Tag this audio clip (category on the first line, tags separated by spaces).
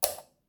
Sound effects > Objects / House appliances
button click press switch